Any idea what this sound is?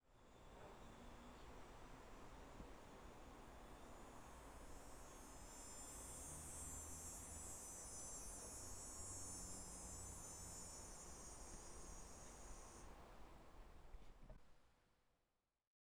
Soundscapes > Nature
Cicadas W breeze 2
Field recording of cicadas with the wind rustling branches